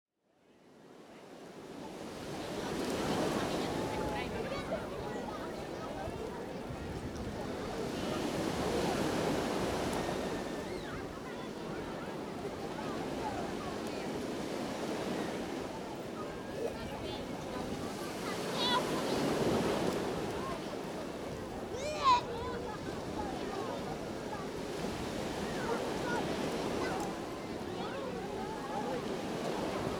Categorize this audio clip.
Soundscapes > Urban